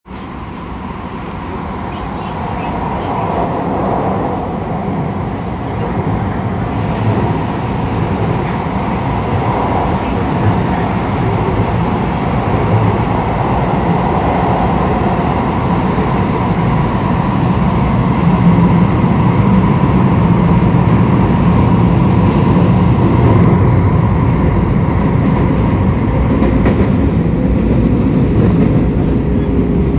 Soundscapes > Urban
keiosen to chofu st. 2
it`s a moment when train departing in chofu station in tokyo. keiosen.
1min, chofueki, keiosen, subway, tokyo, train, tube